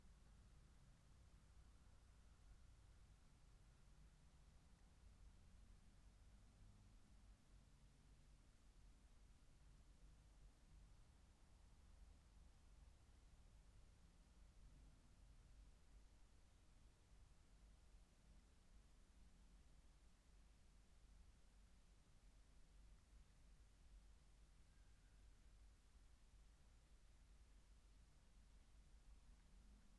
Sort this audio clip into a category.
Soundscapes > Nature